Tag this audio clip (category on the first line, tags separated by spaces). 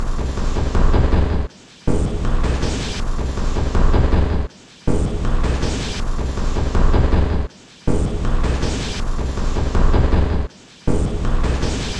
Instrument samples > Percussion
Soundtrack Ambient Industrial Loopable Alien Loop Drum Weird Underground Packs Dark Samples